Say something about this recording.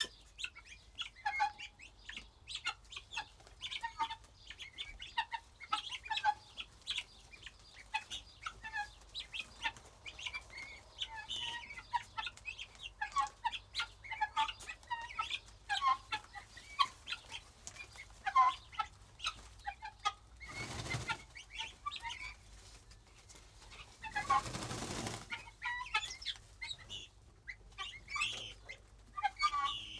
Soundscapes > Nature
Pintades Donzy Le National
A bunch of guinea fowls emitting their strange chuckles. Arguing at ~ 3'50. Recorded at Donzy-Le-National, Bourgogne, France, may 2025 EM272 mics.
chuckle, farm, guinea-fowl, pintade